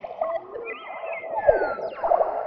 Soundscapes > Synthetic / Artificial
LFO Birdsong 58
Description in master track